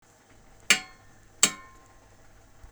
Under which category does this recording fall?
Sound effects > Objects / House appliances